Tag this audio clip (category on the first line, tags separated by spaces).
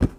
Sound effects > Objects / House appliances
foley bucket household pail object cleaning metal drop slam hollow plastic spill tool knock scoop debris lid carry handle tip container garden clatter pour shake kitchen clang water fill liquid